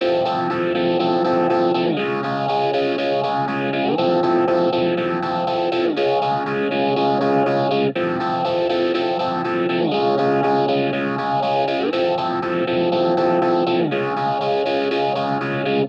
Music > Solo instrument
Guitar loops 124 10 verison 10 120.8 bpm
Otherwise, it is well usable up to 4/4 120.8 bpm.
guitar, loop, bpm, music, simple, reverb, electricguitar, samples, simplesamples, electric, free